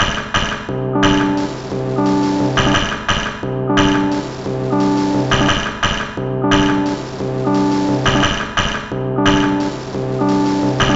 Instrument samples > Percussion
This 175bpm Drum Loop is good for composing Industrial/Electronic/Ambient songs or using as soundtrack to a sci-fi/suspense/horror indie game or short film.
Loopable, Soundtrack, Industrial, Underground, Dark, Drum